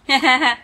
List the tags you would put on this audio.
Speech > Other
female
funny
laugh
woman

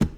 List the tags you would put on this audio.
Sound effects > Objects / House appliances
cleaning
spill

